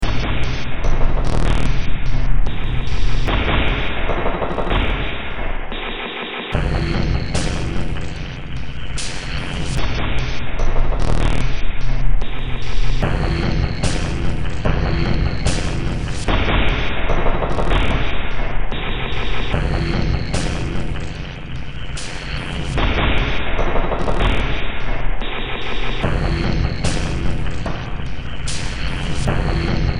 Music > Multiple instruments
Demo Track #4003 (Industraumatic)
Ambient, Cyberpunk, Games, Horror, Industrial, Noise, Sci-fi, Soundtrack, Underground